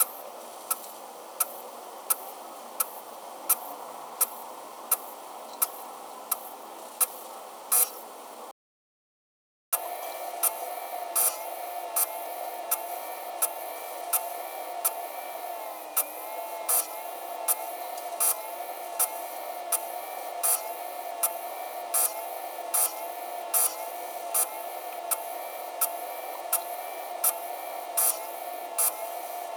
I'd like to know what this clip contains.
Sound effects > Objects / House appliances
A computer hard disk drive failing. It makes clicking, grinding, and whirring sounds. Recorded in 2010. I do not recall what microphone or recorder I used.
computer, whirring, mechanical, hard-drive, motor, hardware